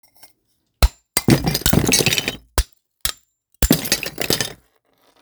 Sound effects > Objects / House appliances
Breaking pot long
A mug being smashed with a hammer - taking several smashes
ceramic, pottery, mug, breaking